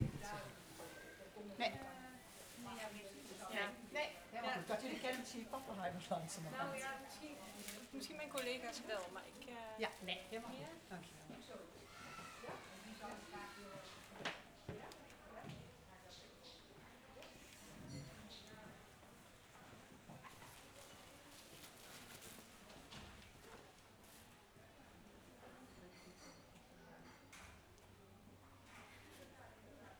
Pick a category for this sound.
Soundscapes > Urban